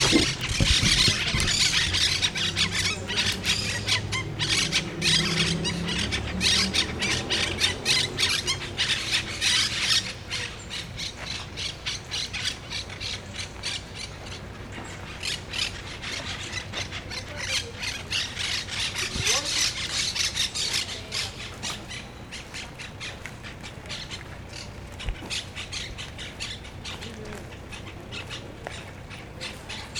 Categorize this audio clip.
Soundscapes > Urban